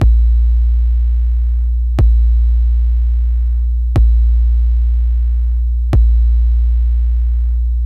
Music > Solo percussion
122 606Mod-BD Loop 07
Modified, Synth